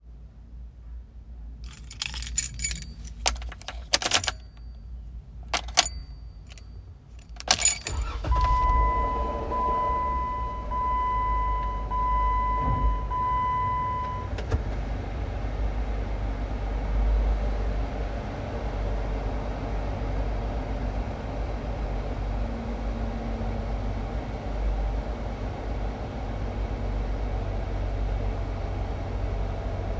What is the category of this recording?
Sound effects > Vehicles